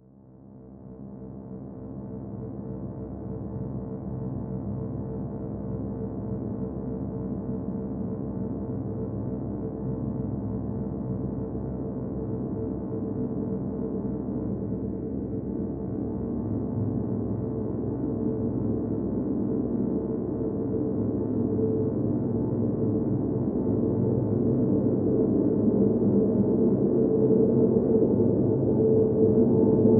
Sound effects > Electronic / Design
A dark, evolving drone created using various plugins.